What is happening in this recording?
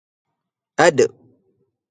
Sound effects > Other
da-sisme
voice, male, arabic, sound, vocal